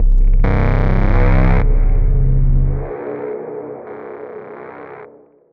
Instrument samples > Synths / Electronic
CVLT BASS 156
bass, bassdrop, drops, lfo, low, lowend, subbass, subs, synth, synthbass, wobble